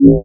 Instrument samples > Synths / Electronic
DISINTEGRATE 4 Db
additive-synthesis, fm-synthesis